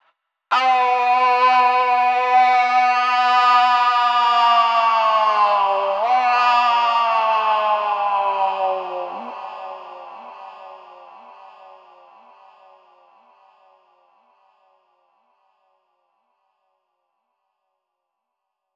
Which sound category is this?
Speech > Other